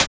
Instrument samples > Percussion
8 bit-Noise Snare Stick3
8-bit, FX, game, percussion